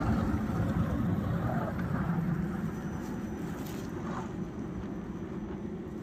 Sound effects > Vehicles
final bus 25
hervanta, finland, bus